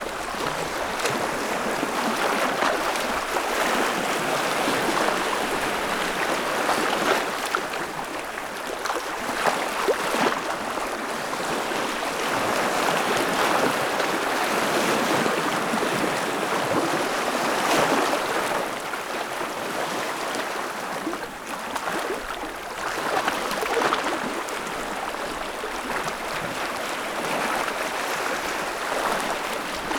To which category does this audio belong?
Soundscapes > Nature